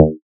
Instrument samples > Synths / Electronic
DUCKPLUCK 4 Eb
additive-synthesis, fm-synthesis